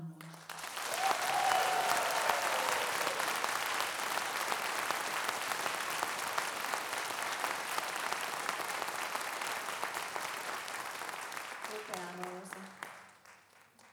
Sound effects > Human sounds and actions
Applause in a concert room. I recorded this with Tascam DR-40.
Applause, concert, handclaps, live